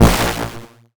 Instrument samples > Synths / Electronic
CINEMABASS 1 Bb
additive-synthesis, bass, fm-synthesis